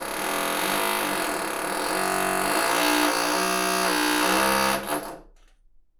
Soundscapes > Indoors
Drilling holes in the wall No. 2
Just when I thought the drilling was over... my upstairs neighbor started again... Recorded with a Zoom H1n and Movo X1-Mini.
drilling, H1n, indoor, MovoX1mini, neighbor, noise, wall, Zoom